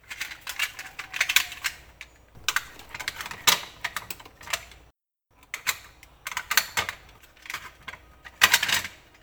Sound effects > Objects / House appliances
A simulated sound of a cassette tape being inserted and ejected inside a player. Apparently, I made this sound effect for a project I'm doing but since we didn't have any old appliances around that I can record, I decided to use a sound alike instead. So what I did was to shake my plastic gamepad phone case (which I do not use) and swish my toothbrush around the plastic toothbrush rack to simulate a sound similar to that of a radio cassette player.
1990s, 1980s, sound-effect, retro, cassette-tape, cassette, tape, sfx